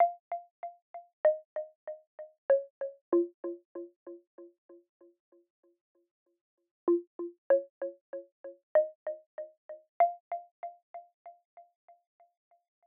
Music > Solo instrument
192bpm - KampanaMelody GMajor - Master
A quick melo fit for Bajo Bass loop.
loop, techno, Synth, electro, Melody, house